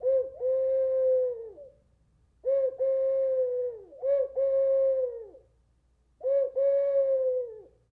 Instrument samples > Wind
Ocarina Owl Like
recorded with Zoom H4n recorder and Sennheiser MKH 416 Shotgun Condenser Microphone ocarina imitates an owl